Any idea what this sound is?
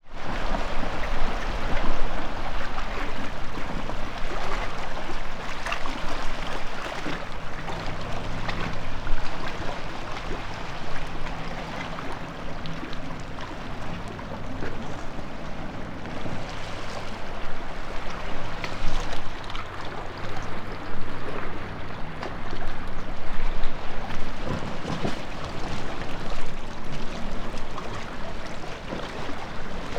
Soundscapes > Nature
The Normandy sea 2
English Channel sea from normandie, Granville. Recorded on December 31, 2025 with Stogie's microfon in a ZOOM F3.